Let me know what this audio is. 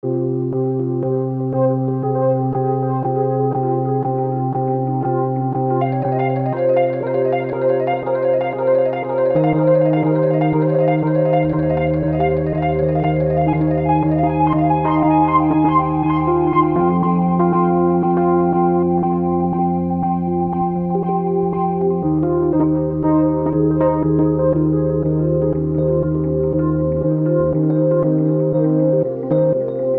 Soundscapes > Synthetic / Artificial
Granular Jazz Piano and Guitar Brilliant Ambient Background Texture #004
Ambient granular background texture with both dark and brilliant components. Made with Digitakt 2.
guitar, atmosphere, texture, soundscape, ambient, brilliant, bright, dark, granular, jazz, background